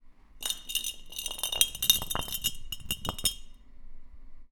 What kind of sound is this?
Sound effects > Objects / House appliances
Glass bottle rolling 6
Concrete, Floor, Bottle, Glass, Wine, Rolling